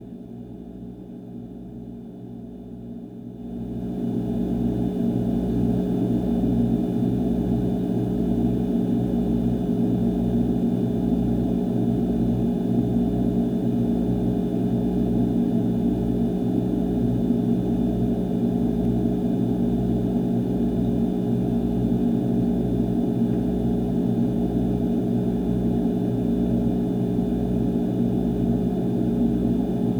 Sound effects > Objects / House appliances
MACHAppl Brazil-Machines, Appliances, Refrigerator, fridge, Hum, Buzz, Light frequency, Interior, Apartment, Rio de Janeiro, Zoom H6, contact mic BF mono
Sound recorded on Zoom H6 with a handmade contact microphone of a refrigerator at night, approximately 1 a.m. There's a high frequency and a strong hum, both constant noises.